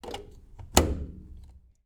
Sound effects > Objects / House appliances
It is a wall charger being plugged in.
plug, socket, Charger